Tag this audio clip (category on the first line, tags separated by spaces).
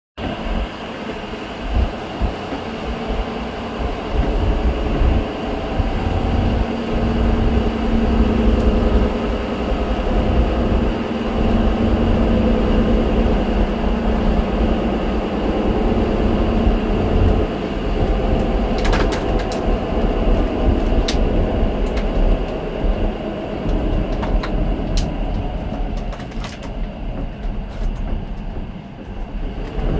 Soundscapes > Indoors
AMBIANCE NOISY RAILWAY SOUNDSCAPE TRAIN